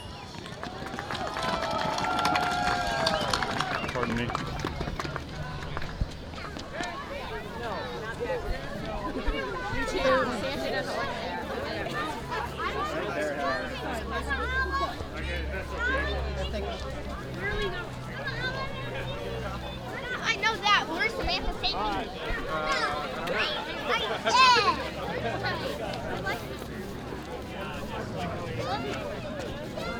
Soundscapes > Urban
Outside ChristmasCrowd December 5 2025
Recorded in a small town in southern Illinois on Friday December 5 2025. The soundscape is a gathering of people excited to attend the official Lighting of the Christmas Tree. A variety of voices are heard, and the overall feeling is happiness and anticipation. Marantz PMD 661 Deity SMic 2-S microphone
Christmas, Field-Recording, Holiday, People-gathering